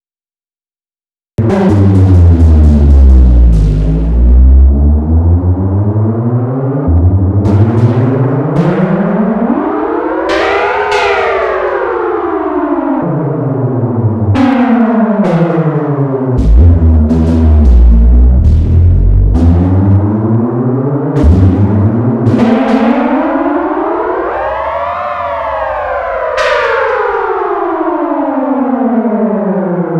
Sound effects > Electronic / Design
Galactic Races near Saturn
alien, atmosphere, electronic, freaky, pad, sci-fi, synth, ufo